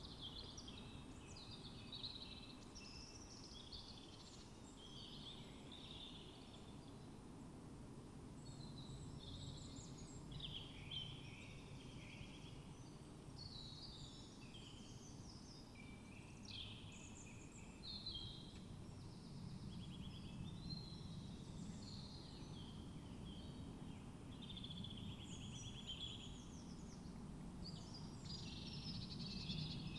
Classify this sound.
Soundscapes > Nature